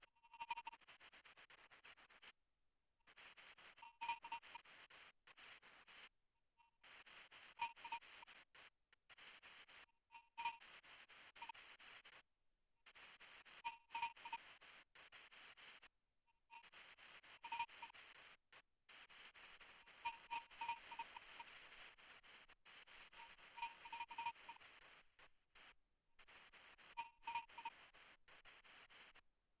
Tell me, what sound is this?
Sound effects > Electronic / Design
Bulb Interference
A noisy, glitchy, distorted sort of sound with harmonic overtones. Sounds a bit like a dodgy light bulb or CRT with intermittent electrical issues recorded up close.
electric, electricity, electronic, glitch, harmonics, noise, scratch, static, stutter